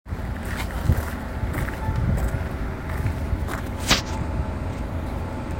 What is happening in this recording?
Soundscapes > Urban
Bus stopped 26
Where: Hervanta Keskus What: Sound of a bus stopped at a bus stop Where: At a bus stop in the evening in a cold and calm weather Method: Iphone 15 pro max voice recorder Purpose: Binary classification of sounds in an audio clip
traffic, bus, bus-stop